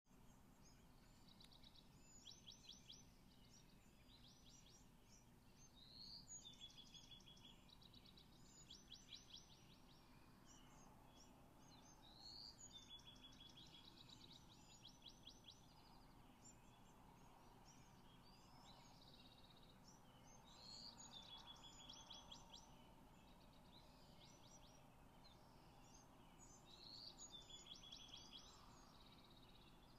Soundscapes > Nature
Early morning birds singing peacefully before the sun comes up! Sound was recorded on a iPhone14 pro max with a TX wireless mic.